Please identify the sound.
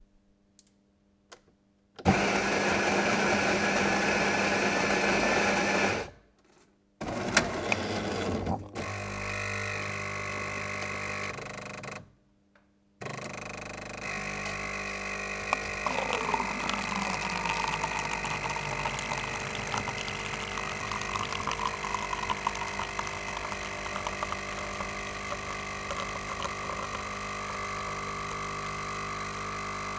Sound effects > Other mechanisms, engines, machines
coffee machine cup
CoffeMachine and some coffe from machninecoffe Recorded that sound by myself with Recorder H1 Essential / in office
Cup, Coffe